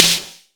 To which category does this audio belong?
Sound effects > Other